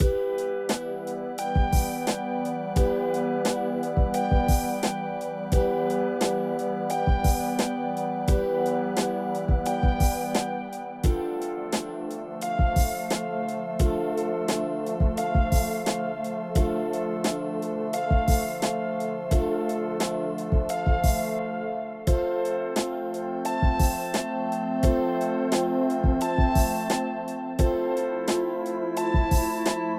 Instrument samples > Synths / Electronic
Sad & Relaxing Lo-fi Melody Loop (87 BPM)
A very simple, sad and relaxing Lo-fi melody loop created in FL Studio. Specifically designed as a seamless loop for game developers and music producers to use in their projects.
background-music, sample, loop, chill, lofi, calm, game-audio, melody